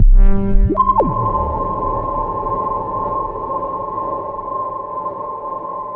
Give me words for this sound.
Instrument samples > Synths / Electronic
CVLT BASS 6
lowend, subwoofer, wobble, wavetable, lfo, subbass, sub, synthbass, stabs, bassdrop, synth, clear, bass, low, drops, subs